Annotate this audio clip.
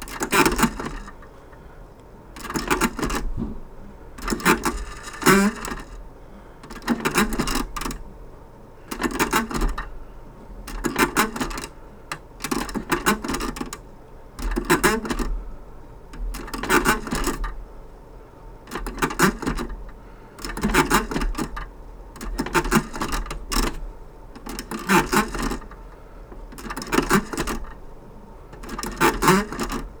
Sound effects > Objects / House appliances
GAMECas-Blue Snowball Microphone, CU Slot Machine, Antique, Lever Pulls Nicholas Judy TDC
An antique slot machine lever pulls.